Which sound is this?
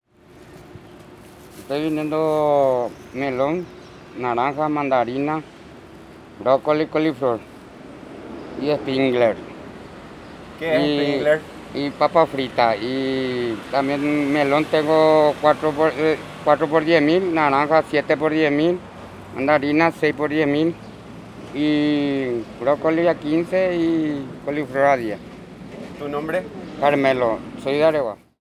Speech > Conversation / Crowd
Vendedor de hortalizas Asuncion
Vocal sound. Street vendor offering fruits and vegetables.
south, america, paraguay, Street, field, asuncion, recording